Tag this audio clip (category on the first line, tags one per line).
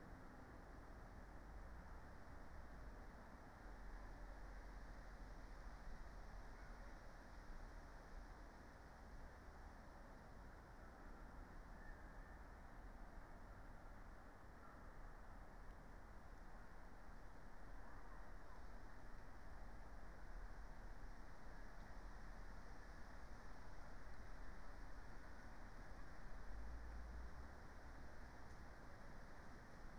Soundscapes > Nature
data-to-sound
Dendrophone
artistic-intervention
alice-holt-forest
field-recording
weather-data
sound-installation
nature
phenological-recording
soundscape
modified-soundscape
natural-soundscape